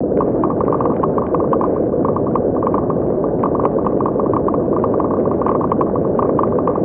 Sound effects > Objects / House appliances
Boiling Water3(Pink Noise Paded)
Boiling, Bubble, Water